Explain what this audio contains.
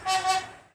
Sound effects > Animals
Recorded with an LG stylus 2022 at Hope Ranch Zoo, these are the calls of the trumpeter swan, made famous by the book, The Trumpet of the Swan, by E.B. White of Charlotte's Web and Stuart Little fame.